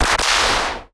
Sound effects > Natural elements and explosions
Whip Sound Effect
Recorded from a real whip and edited in Reaper :D Reverb tail was trimmed entirely, because I wanted to get a cartoon vibe